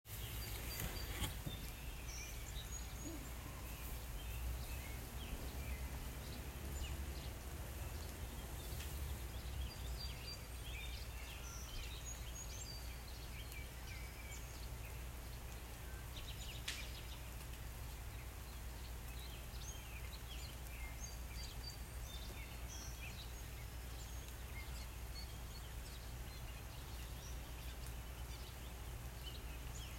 Soundscapes > Nature
Light Rain - June 2025
Recorded with an iPhone XR. Birds were loud as hell this time around.